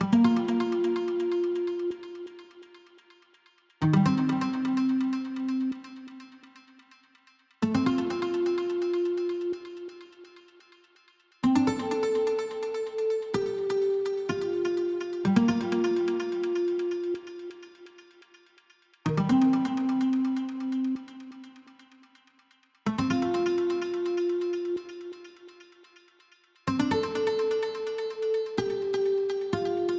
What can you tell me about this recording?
Music > Solo instrument
Melodic Guitar Loop 1 (126 BPM)
A Guitar loop 126 BPM
nylon, clean, acoustic